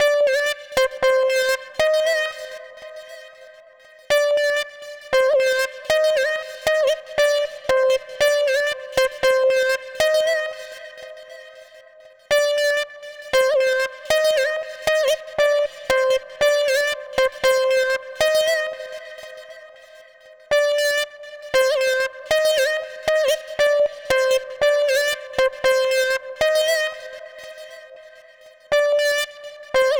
Music > Solo instrument
117 - Scream Alchemy Flute
The unfiltered original of the alchemy flute. Created with a pitch mod in absynth 4 a bit room und shaping.